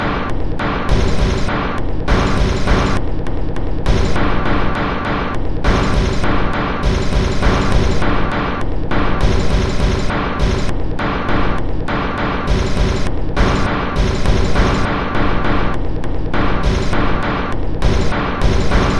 Instrument samples > Percussion
This 101bpm Drum Loop is good for composing Industrial/Electronic/Ambient songs or using as soundtrack to a sci-fi/suspense/horror indie game or short film.
Loop, Weird, Packs, Soundtrack, Samples, Underground, Drum, Ambient, Alien, Loopable, Industrial, Dark